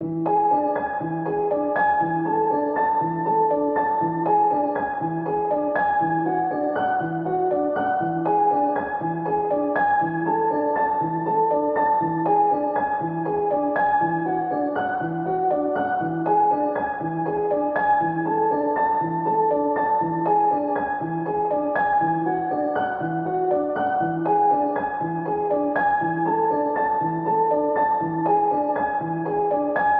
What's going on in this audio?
Music > Solo instrument
Piano loops 158 efect 4 octave long loop 120 bpm
simplesamples, 120, reverb, loop, simple, free, samples, music, piano, pianomusic, 120bpm